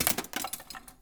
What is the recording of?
Sound effects > Other mechanisms, engines, machines
metal shop foley -189
bam, bang, boom, bop, crackle, foley, fx, knock, little, metal, oneshot, perc, percussion, pop, rustle, sfx, shop, sound, strike, thud, tink, tools, wood